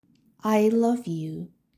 Speech > Solo speech
My wife saying I love you